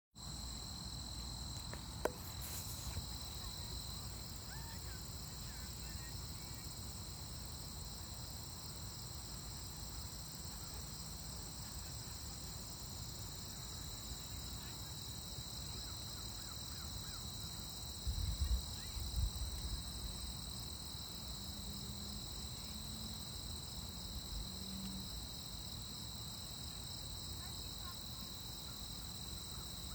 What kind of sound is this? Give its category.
Soundscapes > Nature